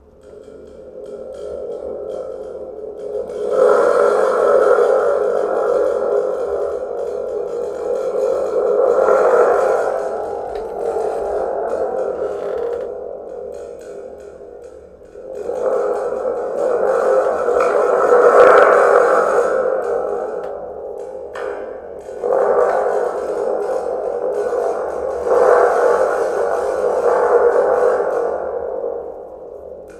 Music > Solo percussion
Long thunder tube rumbles and strikes.